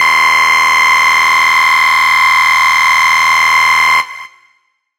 Sound effects > Electronic / Design
BUZZWORTH ONE
A harsh, abrasive synthesized buzzer sound, reminiscent of a 'wrong answer' alert in a game show or a retro arcade error tone. It features a square-wave texture with a sudden onset and short release.
synthetic, glitch, impact, game, hit, sound-design, fx, sound-effect, chiptune, electronic, digital